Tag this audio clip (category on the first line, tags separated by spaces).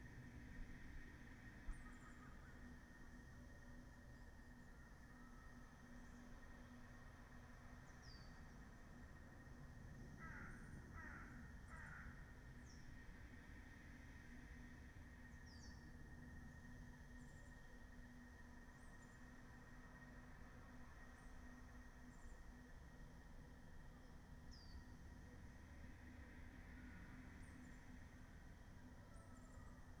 Soundscapes > Nature
artistic-intervention soundscape modified-soundscape alice-holt-forest data-to-sound Dendrophone phenological-recording raspberry-pi nature field-recording weather-data sound-installation natural-soundscape